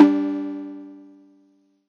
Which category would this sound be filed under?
Instrument samples > Piano / Keyboard instruments